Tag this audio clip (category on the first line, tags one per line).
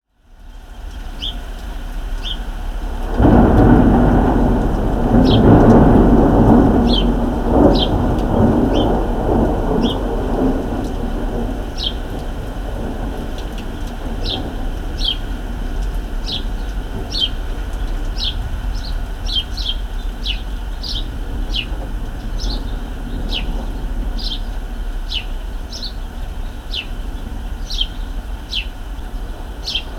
Soundscapes > Urban
field-recording
vibrations
wind
bird
geophone
contact-mic
door
urban
ambient
nature
storm
birds
rain
ambience
sound-design
weather
thunder
internal
thunderstorm
atmosphere